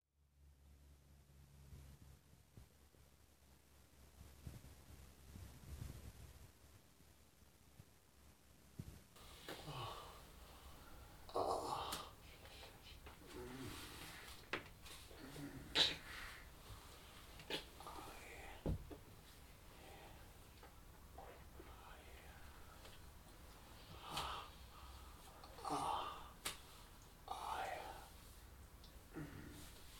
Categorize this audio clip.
Sound effects > Human sounds and actions